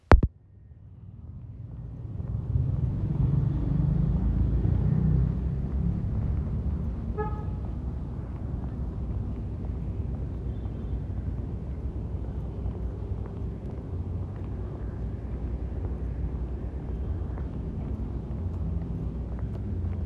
Soundscapes > Urban
llight traffic urban horn of vehicle